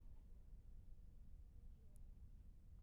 Sound effects > Other
literally just quiet. Recorded with a ZoomH6 by myself
quiet, silence, nothing